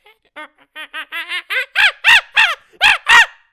Sound effects > Animals
monkey angry
angry, scream, animal, monkey, ape